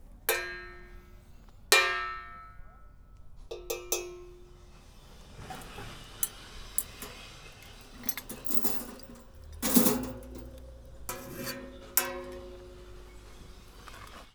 Sound effects > Objects / House appliances
Junkyard Foley and FX Percs (Metal, Clanks, Scrapes, Bangs, Scrap, and Machines) 183
Ambience, Atmosphere, Bang, Bash, Clang, Clank, Dump, dumping, dumpster, Environment, Foley, FX, garbage, Junk, Junkyard, Machine, Metal, Metallic, Perc, Percussion, rattle, Robot, Robotic, rubbish, scrape, SFX, Smash, trash, tube, waste